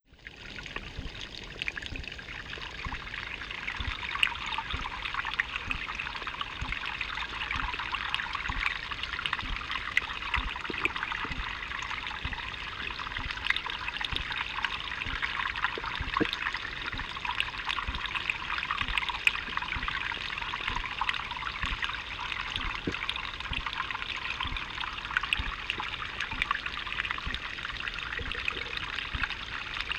Soundscapes > Nature
020 BOTANICO FOUNTAIN HIDROPHONE 2 SMALL
fountain, water, hydrophone